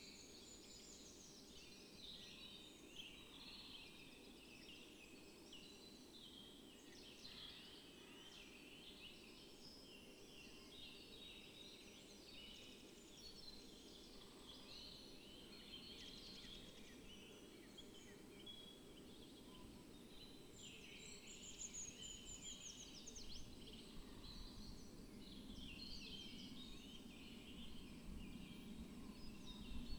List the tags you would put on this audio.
Soundscapes > Nature
alice-holt-forest
sound-installation
data-to-sound
natural-soundscape
Dendrophone
phenological-recording
soundscape
nature
artistic-intervention
raspberry-pi
weather-data
modified-soundscape
field-recording

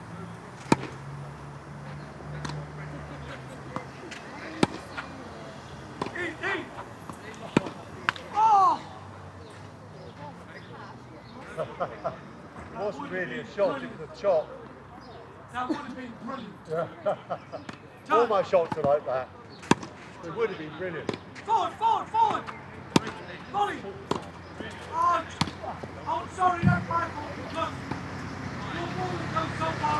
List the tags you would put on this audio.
Soundscapes > Urban
game
2men
tennis
match
balls
sport
shouting
men
english

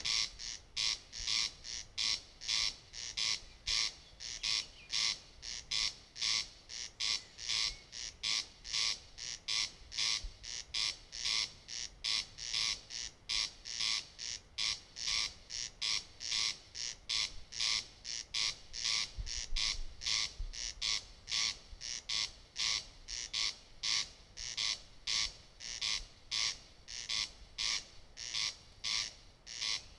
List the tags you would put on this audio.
Soundscapes > Nature
forest
spring
birds

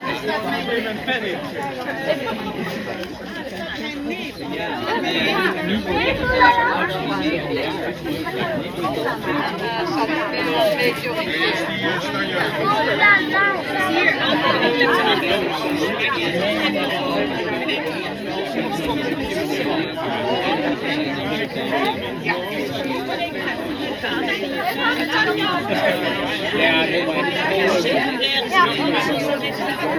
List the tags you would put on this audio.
Speech > Conversation / Crowd
field-recording
talking
crowd
children
people

